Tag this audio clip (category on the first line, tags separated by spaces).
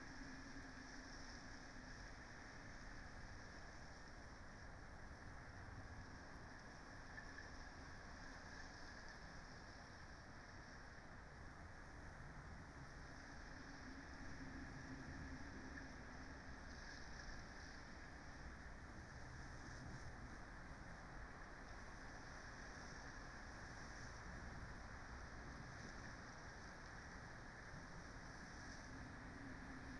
Soundscapes > Nature
field-recording phenological-recording nature raspberry-pi Dendrophone modified-soundscape artistic-intervention alice-holt-forest natural-soundscape sound-installation weather-data soundscape data-to-sound